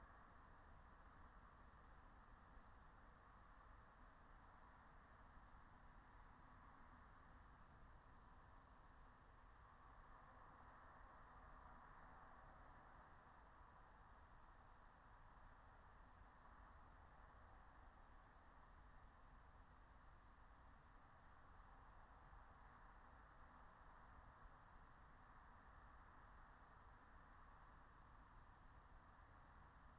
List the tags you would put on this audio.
Soundscapes > Nature
soundscape
raspberry-pi
natural-soundscape
field-recording
nature
phenological-recording
alice-holt-forest
meadow

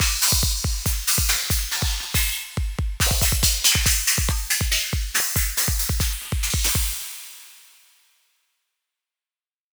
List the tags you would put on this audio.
Music > Multiple instruments
IDM
Melody
Hop
Sub
Trippy
EDM
Bass
Perc
Heavy
Loops
Chill
Loop
TripHop
Groove
Percussion
FX
Bassloop
Downtempo
Hip
Funky

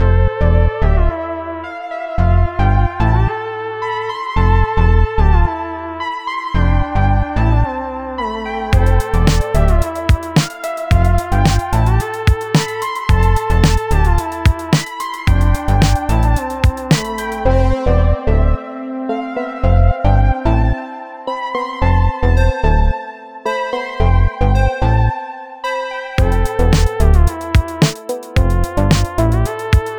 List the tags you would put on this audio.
Music > Multiple instruments
110bpm
drums

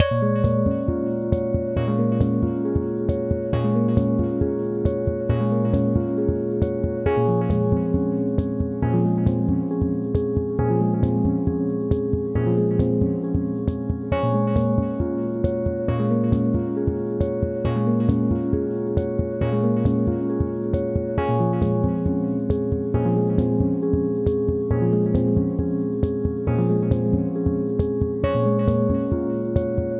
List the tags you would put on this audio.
Music > Multiple instruments
68bpm
propellerheads
music
reason13

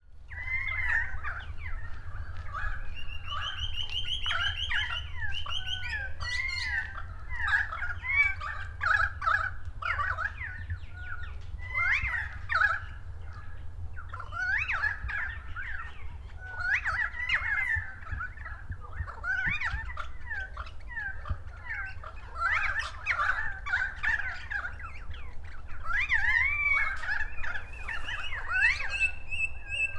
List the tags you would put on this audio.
Soundscapes > Nature
ambience
ambient
bird
birds
birdsong
currawong
field-recording
nature

